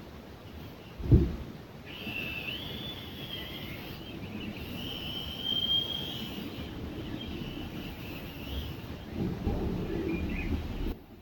Sound effects > Objects / House appliances
Whistle from central heating radiator upper floor
Whistling sound of central heating radiator on the highest floor in the fall while opening the water with a key letting air out in the appartment building in Lithuania recorder with a mobile phone
autumn, boiling, city, Eastern, Europe, fall, fix, heating, high, house, household, industrial, khrushchevka, liquid, Lithuania, radiator, repair, season, steam, urban, water, whistle